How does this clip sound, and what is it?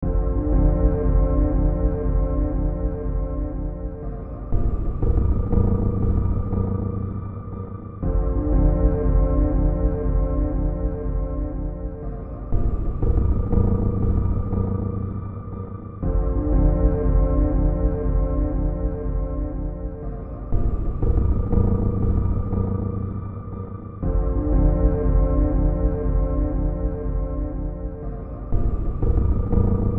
Soundscapes > Synthetic / Artificial
Looppelganger #184 | Dark Ambient Sound
Use this as background to some creepy or horror content.
Sci-fi, Weird, Silent, Ambience, Gothic, Survival, Horror, Ambient, Underground, Soundtrack, Noise, Drone, Darkness, Games, Hill